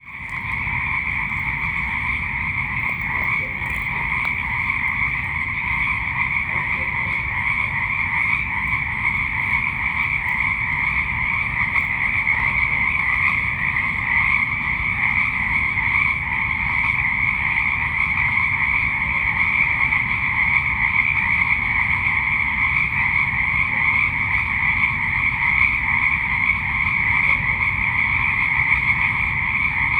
Soundscapes > Nature
Nature recording in the redwoods using Tascam dr-05 field recorder